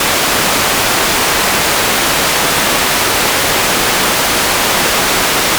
Instrument samples > Synths / Electronic
Noise Oscillator - Roland SE-02